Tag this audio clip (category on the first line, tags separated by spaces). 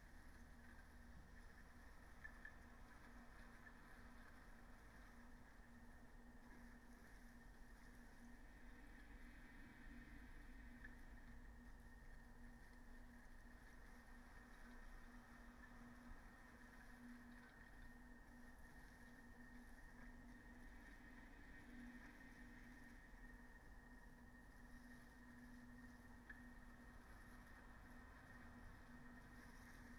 Soundscapes > Nature
field-recording weather-data alice-holt-forest Dendrophone sound-installation modified-soundscape soundscape data-to-sound phenological-recording nature artistic-intervention raspberry-pi natural-soundscape